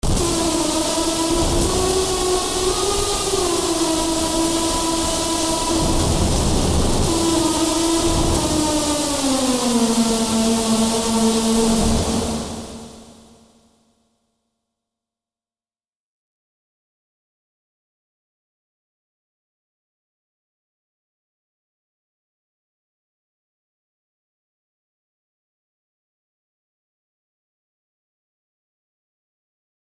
Sound effects > Experimental
!vERGBAL sYNTH!
Recorded in various ways, with way to many sound effects on them
distortion, sample, synth